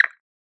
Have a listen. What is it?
Sound effects > Objects / House appliances
Drips PipetteDripFast 2 Shaker
pipette, drip, water